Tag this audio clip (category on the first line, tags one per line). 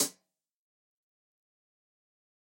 Instrument samples > Percussion
distorted
percussion
drum
hit
lofi
lo-fi
crunchy
perc
electronic